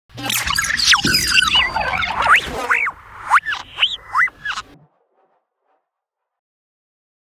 Sound effects > Experimental
Alien Animalia -002
a collection of trippy alien animal sounds from processed animal recordings